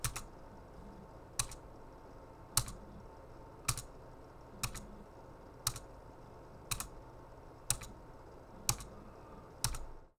Sound effects > Objects / House appliances
CMPTKey-Blue Snowball Microphone, CU Caps Lock Nicholas Judy TDC
Pressing a caps lock button on a keyboard.
button, keyboard, foley, Blue-Snowball, Blue-brand